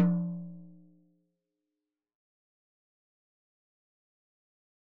Music > Solo percussion
Hi Tom- Oneshots - 48- 10 inch by 8 inch Sonor Force 3007 Maple Rack
toms, oneshot, perc, beats, fill, beat, instrument, drum, tomdrum, kit, roll, tom, flam, percs, velocity, hitom, hi-tom, rimshot, percussion, drums, acoustic, studio, beatloop, drumkit, rim